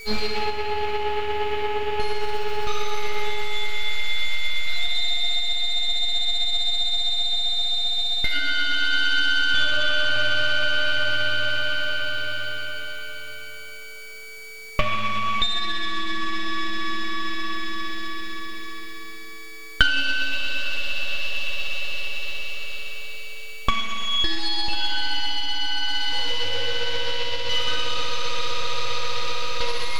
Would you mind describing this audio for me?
Sound effects > Electronic / Design
Ghost Shine 3
A sample of our blade sharpener is explored. This is an abstract noisy sample pack suitable for noise, experimental or ambient compositions. The original audio sample in the pack is called, 'Backyard Blade Sharpener' and all other samples in the pack are derivatives of it made using grslanular synthesis and glitch software.
halloween, commons, haunting, noise, noise-ambient, creepy, spooky, ambient, cinematic, creative, abstract